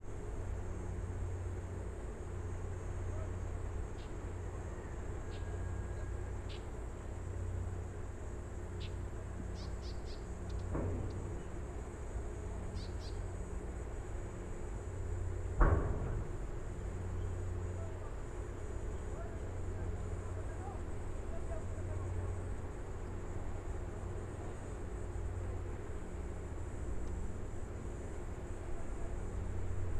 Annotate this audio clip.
Soundscapes > Urban
250823 101954 PH Harbour from a hill
Harbour from a hill. (Take 2) I made this recording in the morning, from a hill located near the harbour of Calapan city (Oriental Mindoro, Philippines). One can hear the hum of the harbour, with machines and distant voices, as well as cicadas and insects, birds, and some wind at times. Recorded in August 2025 with a Zoom H5studio (built-in XY microphones). Fade in/out applied in Audacity.
cicadas, harbour, ambience, pier, port, day, ship, Calapan-city, hum, crickets, Philippines, atmosphere, urban, breeze, soundscape, machines, birds, voices, engines, machine, industrial, engine, distant, field-recording, insects